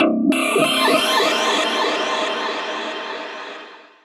Instrument samples > Synths / Electronic
CVLT BASS 21
lowend, stabs, subbass, synth, subs, clear, lfo, low, bassdrop, wavetable, bass, sub, wobble, drops, synthbass, subwoofer